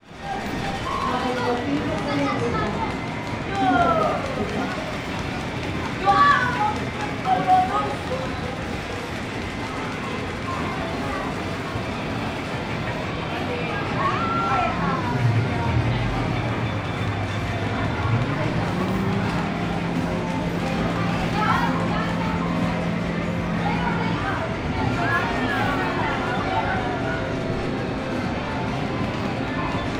Soundscapes > Indoors
Arcade games area. (Take 1) I made this recording in Xentro mall, located in Calapan city (Oriental Mindoro, Philippines). One can hear the atmosphere of the noisy arcade games area, with kids and teen-agers playing. Recorded in August 2025 with a Zoom H5studio (built-in XY microphones). Fade in/out applied in Audacity.